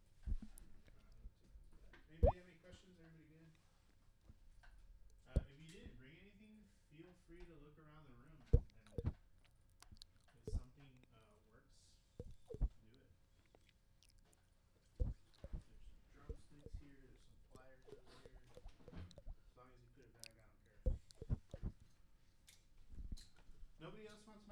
Sound effects > Natural elements and explosions
Water Droplet (made by me)
Quick water droplet sound created by flicking/lightly tapping my cheek and somewhat whistling/breathing in at the same time. Captured on an SM58.
droplet, nature, water